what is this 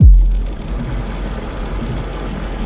Sound effects > Electronic / Design
Kick noise modular 2
Modular kick drum with noise tale was made on the modular synthesiser with sampler Rample by Squarp Instruments and Beads by Mutable Instruments.
kick, Modular, noise